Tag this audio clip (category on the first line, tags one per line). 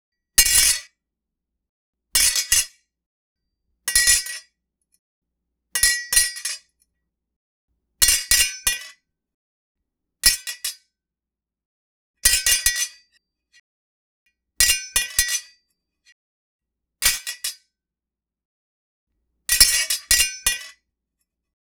Sound effects > Objects / House appliances
attack
battle
blade
combat
designed
drop
dropping
duel
fight
fighting
hard
karate
knife
knight
kung-fu
martialarts
medieval
melee
metal
metallic
scatter
surface
sword
swords
TMNT
weapon
weapons